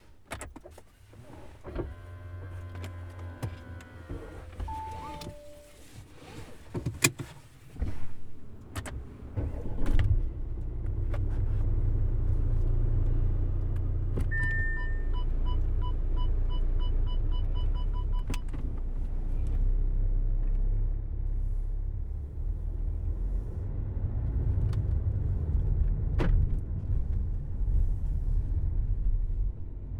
Soundscapes > Urban
Car turning on and start driving
The sound of a car which is turned on and then starts driving. It is an Audi A4 and it produces this low-frequency mellow sounds that can be used similar to some kind of fat noise.
parking, car, motor, keys